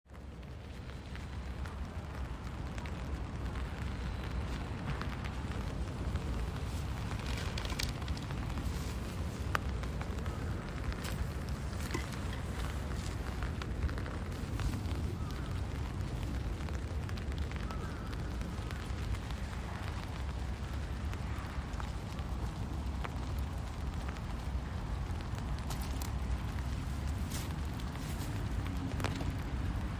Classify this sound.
Soundscapes > Other